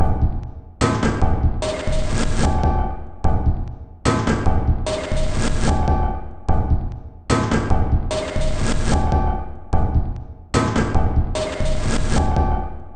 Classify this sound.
Instrument samples > Percussion